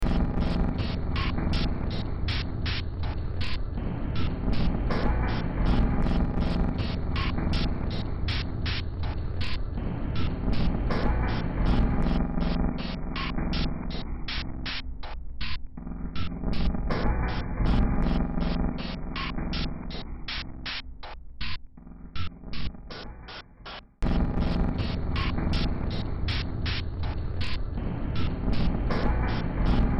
Music > Multiple instruments
Demo Track #3699 (Industraumatic)
Sci-fi, Underground, Ambient, Noise, Horror, Soundtrack, Industrial, Games, Cyberpunk